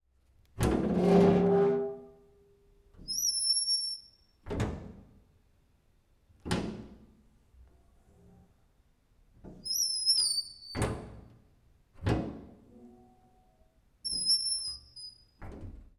Sound effects > Objects / House appliances
Wardrobe. Chest of drawers. Door. Door creaking.
Recorded that sound by myself with Recorder H1 Essential